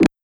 Sound effects > Human sounds and actions
LoFiFootsteps Stone Walking-04
Shoes on stone and rocks, walking. Lo-fi. Foley emulation using wavetable synthesis.
footstep, jog, jogging, lofi, rocks, steps, stone, synth, walk, walking